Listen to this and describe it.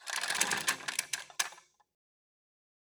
Sound effects > Other mechanisms, engines, machines
Pull Chain-09
Pull-chain on a loading door mechanism